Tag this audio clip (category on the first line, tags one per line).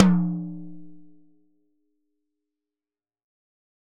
Music > Solo percussion
roll
beats
rimshot
hitom
tomdrum
instrument
hi-tom
studio
drumkit
perc
fill
toms
percs
drum
flam
oneshot
tom